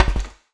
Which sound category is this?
Sound effects > Electronic / Design